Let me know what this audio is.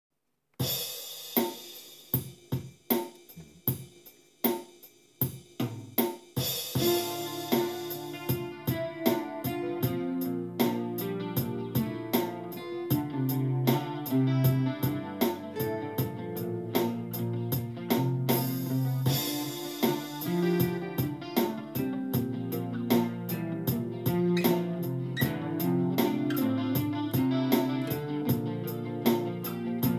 Music > Multiple instruments
Clean Vibes
Drums and Sound by BOSS BR 80, Ibanez E-Guitar, 2 Tracks
Instrumental, Acoustic-Guitars, BR80-Drums